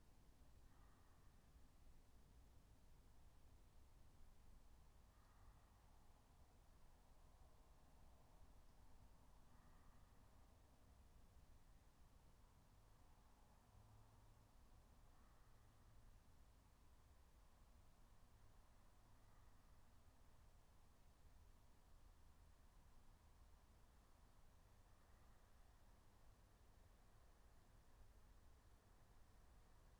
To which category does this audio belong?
Soundscapes > Nature